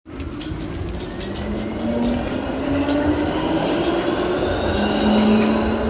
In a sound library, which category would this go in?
Sound effects > Vehicles